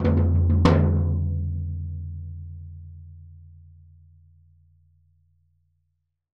Music > Solo percussion
floor tom-Fill Ending 2 - 16 by 16 inch
tomdrum, rimshot, beatloop, beats, velocity, instrument, percussion, fill, perc, rim, acoustic, drums, floortom, beat, tom, roll, percs, drumkit, flam, kit, toms, studio, drum, oneshot